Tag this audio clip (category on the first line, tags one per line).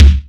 Instrument samples > Percussion
16x16-inch
16x16-inches
bubinga
death
death-metal
drum
drumset
DW
floor
floortom
floortom-1
heavy
heavy-metal
Ludwig
Majestic
metal
Pearl
pop
rock
sapele
sound-engineering
Tama
Tama-Star
thrash
thrash-metal
timpano
tom
tom-tom
unsnared